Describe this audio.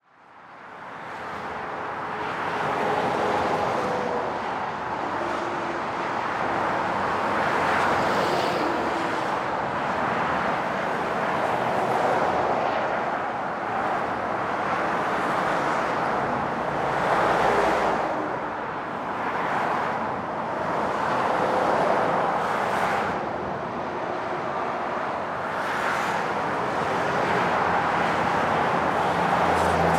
Soundscapes > Other
A morning recording of the M6 Motorway.